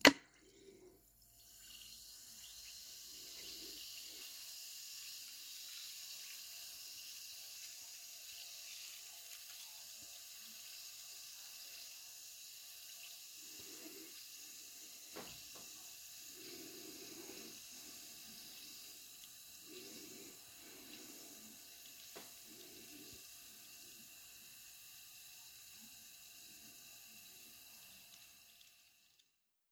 Sound effects > Natural elements and explosions
WATRFizz-Samsung Galaxy Smartphone, MCU Antacid, Tablet, Drop, Fizz Nicholas Judy TDC
An antacid tablet drop with a plop and fizzing.
antacid, drop, fizz, Phone-recording, plop, tablet